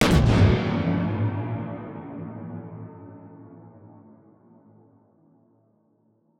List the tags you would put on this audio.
Sound effects > Experimental
abstract
alien
clap
crack
edm
experimental
fx
glitch
glitchy
hiphop
idm
impact
impacts
laser
lazer
otherworldy
perc
percussion
pop
sfx
snap
whizz
zap

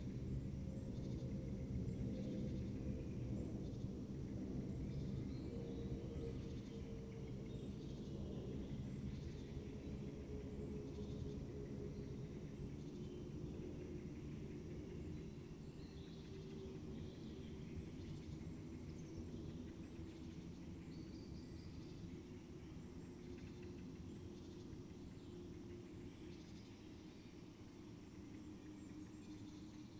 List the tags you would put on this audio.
Soundscapes > Nature
raspberry-pi
soundscape
natural-soundscape
field-recording
alice-holt-forest
artistic-intervention
modified-soundscape
sound-installation
nature
weather-data
data-to-sound
Dendrophone
phenological-recording

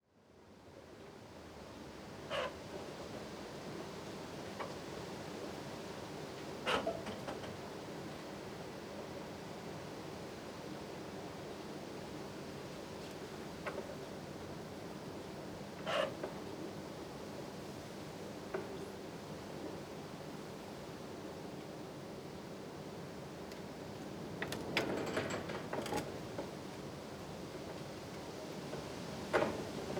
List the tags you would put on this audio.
Soundscapes > Nature
car
creaking
evening
field
garden
recording
train
wind

